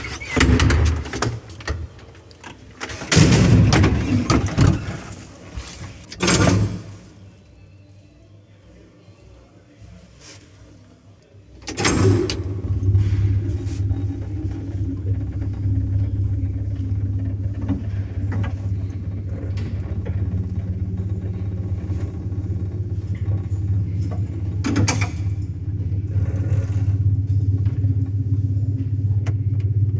Sound effects > Other mechanisms, engines, machines
Old Elevator
Old soviet elevator that still works. Recorded with Redmi Note 12S. 00:00 - closing doors 00:06 - pressing button (single) 00:11 - pressing button and start moving 00:36 - stopping
door, open, horror, rusty, reverb, lift, elevator, close, soviet